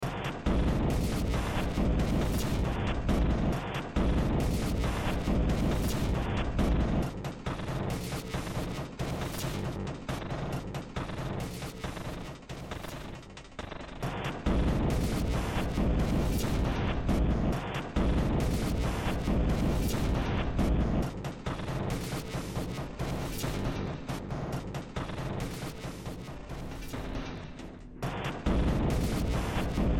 Music > Multiple instruments
Short Track #3224 (Industraumatic)
Ambient, Cyberpunk, Games, Horror, Industrial, Noise, Sci-fi, Soundtrack, Underground